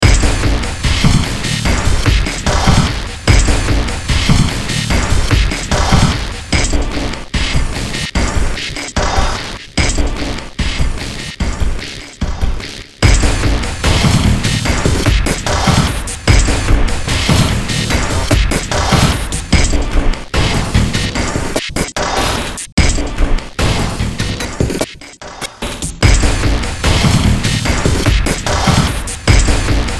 Music > Multiple instruments
Short Track #3497 (Industraumatic)
Cyberpunk, Games, Underground, Horror, Ambient, Soundtrack, Noise, Industrial, Sci-fi